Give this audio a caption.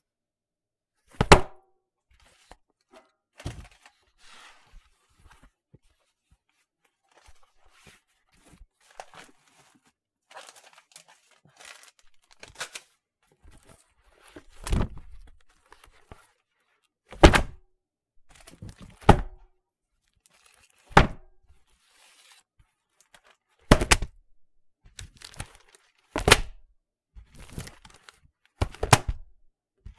Sound effects > Objects / House appliances
Picking up and dropping an office binder full of papers multiple times.